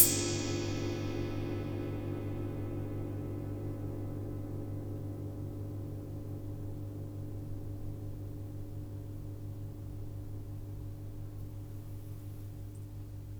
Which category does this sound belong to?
Instrument samples > Other